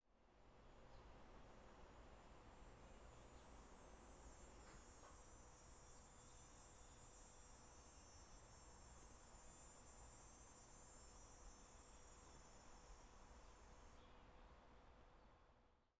Soundscapes > Nature
Cicadas Distant
Field recording of distant cicadas
Bug, Cicada, Day, Natural, Nature, Recording, Summer